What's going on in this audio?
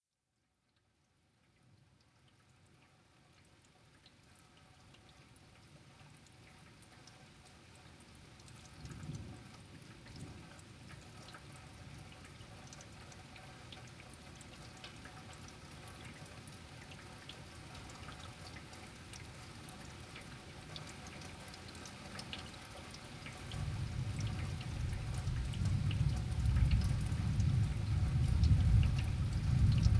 Soundscapes > Nature
Synthesis of three storms into a 45 minute take. Good clear thunder claps to be found throughout, but also lots of brooding, distant rumbles and scuds. Rain of various intensities throughout. Good material to mine for thunder. Good ambient background for storms. Good for just relaxing.
field-recording, nature, rain, thunder, thunderbolt, thunderclap, thunderstorm, weather